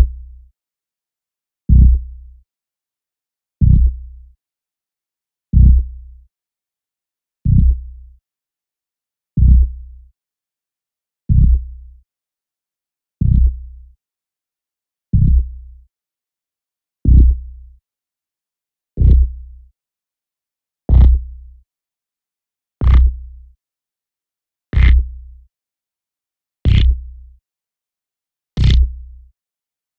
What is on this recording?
Instrument samples > Synths / Electronic
vata bass loop 1
I love synthesizing sounds and creating them. I often write sounds from the nature of the forest and then synthesize them into ableton and additional plug-ins. thanks to this site, which gives you the opportunity to share your synthesis.
bass, electronic, loop, synth, techno